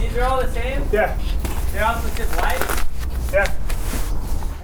Sound effects > Objects / House appliances
Junkyard Foley and FX Percs (Metal, Clanks, Scrapes, Bangs, Scrap, and Machines) 104
Perc, Ambience, trash, waste, SFX